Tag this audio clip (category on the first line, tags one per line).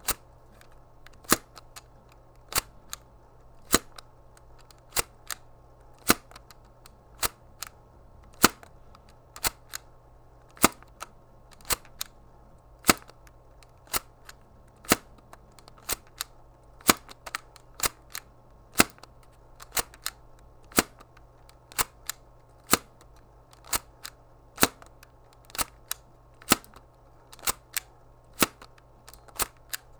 Sound effects > Objects / House appliances
camera
lens
fuji-instax-mini-9
Blue-brand
Blue-Snowball
off
shutter
foley